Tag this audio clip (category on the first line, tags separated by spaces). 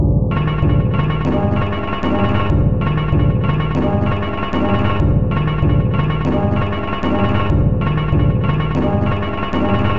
Instrument samples > Percussion
Industrial Drum Samples Ambient Underground Alien Loop Dark Weird Soundtrack Loopable Packs